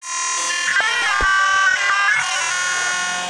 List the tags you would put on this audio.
Sound effects > Electronic / Design
Abstract
Alien
Analog
Creatures
Digital
Glitch
Neurosis
Noise
Otherworldly
Trippin

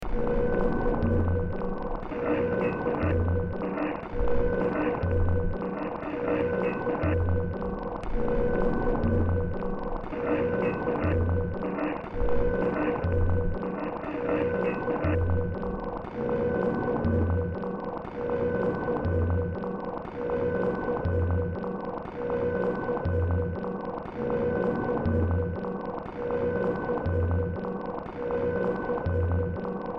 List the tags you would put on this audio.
Music > Multiple instruments
Ambient
Horror

